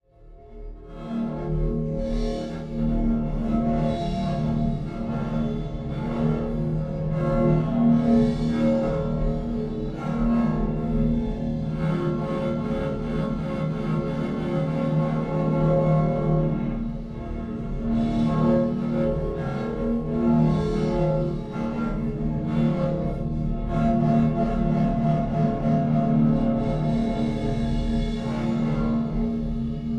Sound effects > Electronic / Design
Glitched Futuristic AI World, made by using Synthesized drums thru granular sampler,